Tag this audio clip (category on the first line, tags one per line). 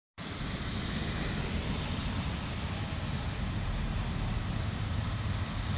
Soundscapes > Urban
Car
passing
studded
tires